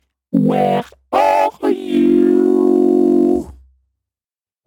Speech > Other
Creepy clown say : Where are you ? Record with my own voice, edit by Voicemod